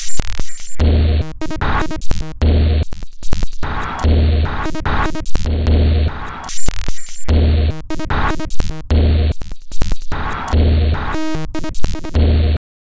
Instrument samples > Percussion
This 148bpm Drum Loop is good for composing Industrial/Electronic/Ambient songs or using as soundtrack to a sci-fi/suspense/horror indie game or short film.
Dark, Loopable, Industrial, Underground, Soundtrack, Loop, Ambient, Weird, Samples, Packs, Alien, Drum